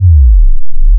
Sound effects > Other
A 1-second low-frequency sine sweep from 200 Hz down to 50 Hz with quick 0.05 s fade-in/out, perfect for a sub-bass “hit” before a section returns.